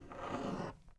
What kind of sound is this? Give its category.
Sound effects > Other mechanisms, engines, machines